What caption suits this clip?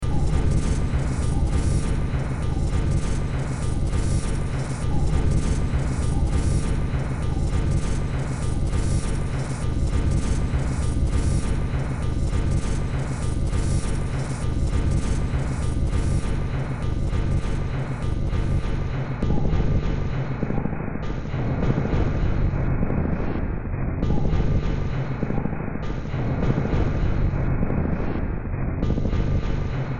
Music > Multiple instruments
Demo Track #3875 (Industraumatic)
Industrial, Games, Cyberpunk, Underground, Noise, Ambient, Sci-fi, Soundtrack, Horror